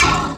Instrument samples > Percussion
alien bassride 2
ride alien fake bassride bell fakeride effect drum drums backing cymbal cymbals metal metallic Zildjian Sabian Meinl Paiste Istanbul Bosporus China clang clatter clangor clash resound chime
Paiste, China, cymbal, drums, Bosporus, metal, alien, cymbals, Meinl, clang, clash, bell, fakeride, clangor, effect, bassride, Sabian, metallic, clatter, Zildjian, backing, drum, ride, resound, fake, Istanbul, chime